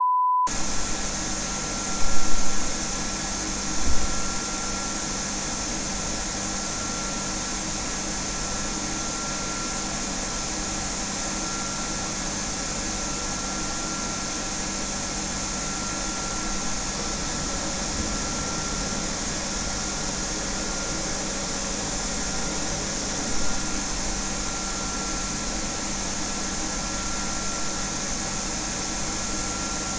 Soundscapes > Indoors
Industrial plant atmos 4 of 4
Field recording (4 of 4) of an industrial water plant containing electric pumps and water purification equipment. Recorded with Tascam DR-05